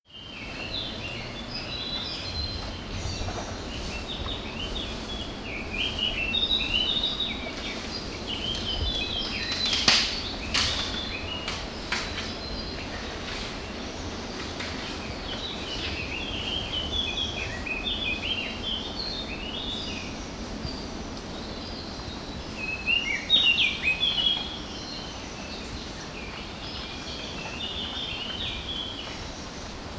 Soundscapes > Nature
032 BAMBOO AMBIENT BIRDS BAMBO'SQUEAKS BIRDS MOSQUITOE
ambient, bamboo, birds, mosquitoe